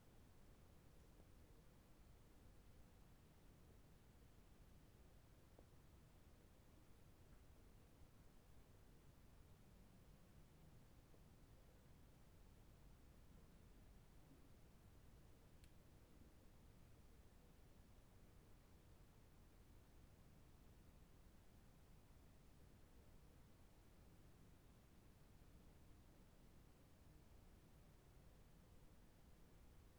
Soundscapes > Indoors
Ambience Indoor QuietRoomTone
Record - zoom-h1n
ambient, atmosphere, background, field-recording, indoor, low-noise, quiet, room-tone, silence, soundscape, subtle